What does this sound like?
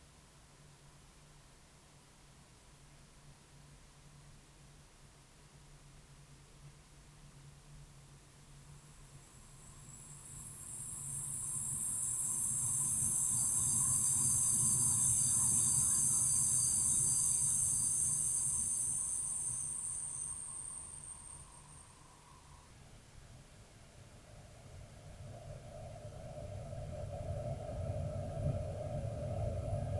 Soundscapes > Synthetic / Artificial
Illusion Dark Forest Stretched Mouseclicks Rec
The original sounds of laptop scraping and mouse clicks (recorded in Audacity) were heavily time-stretched in PaulXStretch. The result is an illusion of a dark forest soundscape with pseudo-insects and pseudo-wind. You can use it to create a dark atmosphere in games, videos, etc.
background-sound, illusion, paulxstretch, windy